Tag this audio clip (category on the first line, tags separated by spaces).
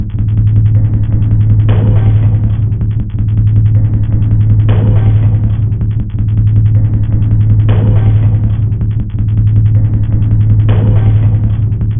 Instrument samples > Percussion
Weird Soundtrack Ambient Loop Samples Dark Industrial Underground Packs Alien Loopable Drum